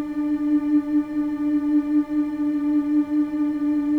Instrument samples > Synths / Electronic
Long Synth Sound
1shot, Airy, Garage, High, Hiphop, Jungle, Long, Synth